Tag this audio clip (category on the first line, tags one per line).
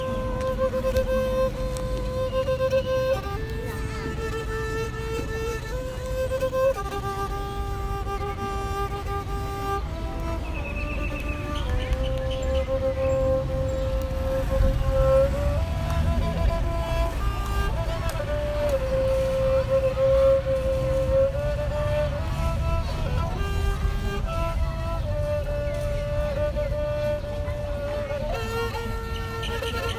Soundscapes > Nature
Kanchanaburi
insects
crickets
ambient
nature
soundscape
Thailand
sao
peaceful
natural